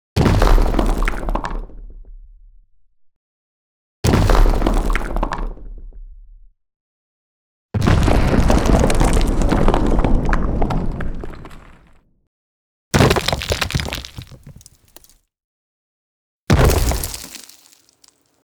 Sound effects > Natural elements and explosions
custom rock impact sounds 09232025
impactful, impact, boom, elements, hit, blast, earth, rock, fall, superpower, explosive, meteor, rocks, big, crater, boulder, debris, elemental, superhero, anime, designed, astroid, fate, natural, huge